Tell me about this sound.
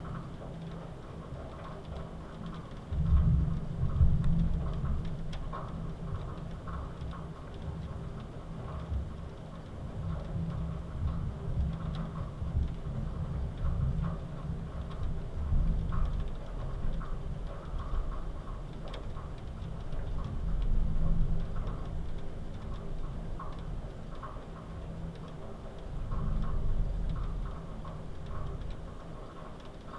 Soundscapes > Nature
Light rain recorded early in the morning at 5am. Rain is falling on the balcony, which is metallic and produces some interesting sound variations Recorded with Tascam Portacapture X6